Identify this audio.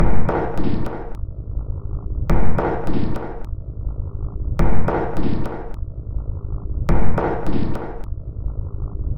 Instrument samples > Percussion
This 209bpm Drum Loop is good for composing Industrial/Electronic/Ambient songs or using as soundtrack to a sci-fi/suspense/horror indie game or short film.
Loopable, Drum, Samples, Soundtrack, Alien, Dark, Weird, Packs, Ambient, Industrial, Underground, Loop